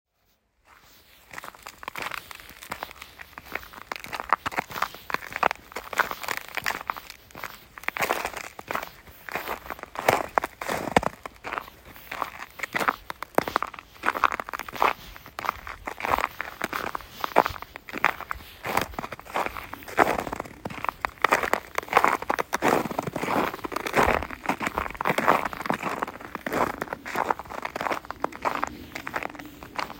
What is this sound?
Soundscapes > Nature
Footsteps in snow/ice - Takayama - Japan
Recording of footsteps at Sakurayama Hachimangu Shrine in Takayama, Japan. 16/1/25
snow, ice, nature